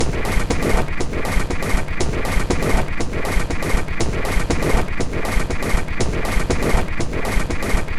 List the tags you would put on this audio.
Instrument samples > Percussion
Dark
Ambient
Alien
Loop
Weird
Loopable
Samples
Underground
Industrial
Drum
Packs
Soundtrack

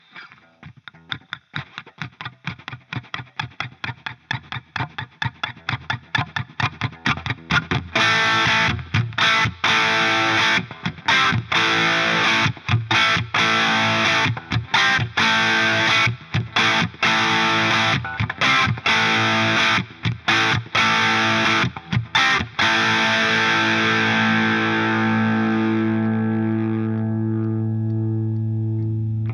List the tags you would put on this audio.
Music > Solo instrument
rock
metal

